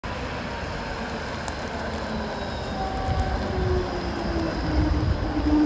Soundscapes > Urban
A tram passing the recorder in a roundabout. The sound of the tram breaking can be heard. Recorded on a Samsung Galaxy A54 5G. The recording was made during a windy and rainy afternoon in Tampere.